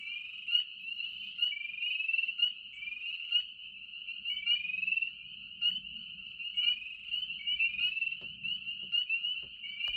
Sound effects > Animals
taken in canada with iphone 13 around midnight